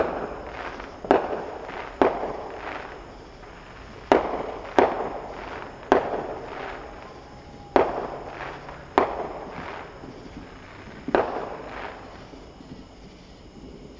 Sound effects > Other
Fireworks samples recorded during a heat wave in the southeast United States, July 4, 2025. Like a weed, the American Dream is constantly growing, under attack and evolving. Some people hate it and want to destroy it, some people tolerate living within it and some people glorify it.
samples, day, patriotic, free-samples, america, fireworks-samples, independence, United-States, sample-packs, electronic, explosions, fireworks, experimental, sfx